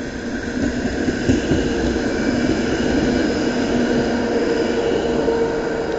Soundscapes > Urban
Passing Tram 3
city, trolley